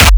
Instrument samples > Percussion
BrazilFunk Kick 16
A easy kick made with 707 kick from flstudio original sample pack, used Waveshaper maxium output to make a crispy punch. Then I layered Grv kick 13 from flstudio original sample pack too. Processed with ZL EQ.